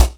Instrument samples > Percussion
hi-hatized crash basic 1950 1b
It's a bass hi-hat. This is a bass hi-hat wavefile based on a namesake re-enveloped crash file you can find in my crash folder.
Bosporus, brass, bronze, chick-cymbals, click, closed-cymbals, closed-hat, crisp, cymbal-pedal, dark, dark-crisp, drum, drums, facing-cymbals, hat, hat-cymbal, hat-set, hi-hat, Istanbul, Meinl, metal, metallic, minicymbal, Paiste, percussion, picocymbal, Sabian, snappy-hats, tick, Zildjian